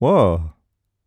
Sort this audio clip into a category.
Speech > Solo speech